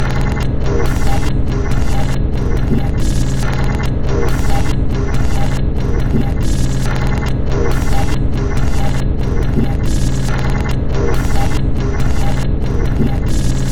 Instrument samples > Percussion
This 140bpm Drum Loop is good for composing Industrial/Electronic/Ambient songs or using as soundtrack to a sci-fi/suspense/horror indie game or short film.
Alien, Ambient, Dark, Drum, Industrial, Loop, Loopable, Packs, Samples, Soundtrack, Underground, Weird